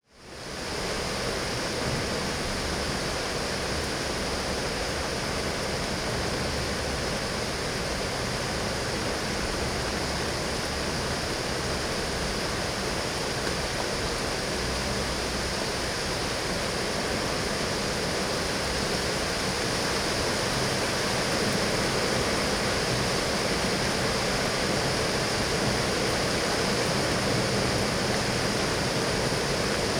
Soundscapes > Nature
RAINVege Summer Rain On Balcony GILLE GRAZ ReynoldsType4 Binaural 48-24
ambisonics, binaural, rain, spatial